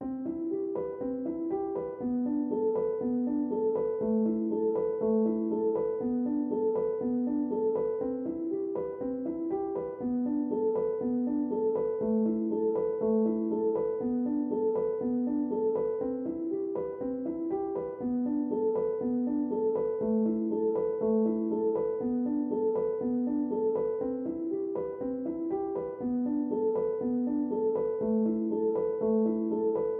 Music > Solo instrument
Piano loops 192 octave down long loop 120 bpm
simplesamples, 120bpm, pianomusic, music